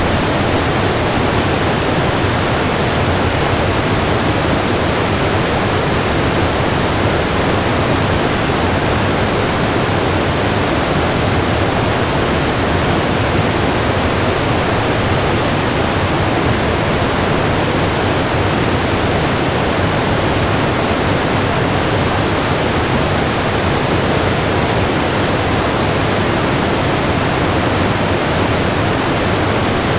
Sound effects > Natural elements and explosions
Albi Damn from the lavoire - MKE600 vs NT5
Subject : Albi's dam taken from the no longer functional washhouse at the north of river. Date YMD : 2025 June 28 Location : Albi 81000 Tarn Occitanie France. Hardware : Tascam FR-AV2 MKE600 on left channel, Rode NT5 on right channel. Weather : Sunny and very hot (38°c during the day) 40% humidity, little to no wind.
2025, 81000, Albi, dam, France, FR-AV2, hypercadrioid, june, MKE600, noise, NT5, Occitanie, Outdoor, Rode, Sennheiser, shotgun-mic, Tarn, Tascam, water, white-noise